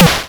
Instrument samples > Percussion
another retro snare :3c
snare made in openmpt 3.7 stars??? why? is it not retro enough?
hit, drums, snare, retro